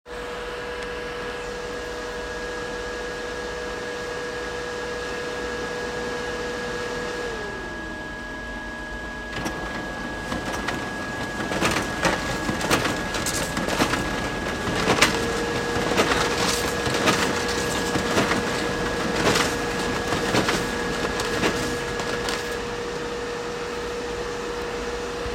Soundscapes > Indoors
Recorded from my iphone of a toner-based printer at work used to print labels. its printing clearance labels here